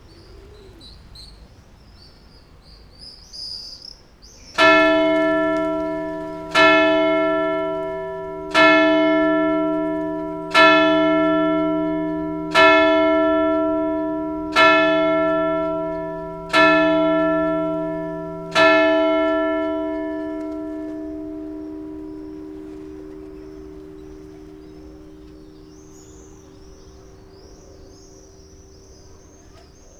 Sound effects > Other mechanisms, engines, machines
250702 08h01 Albi Eglise de la Madeliene - Bells
Subject : Recording the 8am church bells of Albi Madeleine. They ring around 08:00:55s Date YMD : 2025 July 02 Location : Albi 81000 Tarn Occitanie France. Sennheiser MKE600 with stock windcover P48, no filter. Weather : Small wind/breeze of air, grey sky 22° 84% humidity. Processing : Trimmed in Audacity. Notes : Tips : With the handheld nature of it all. You may want to add a HPF even if only 30-40hz.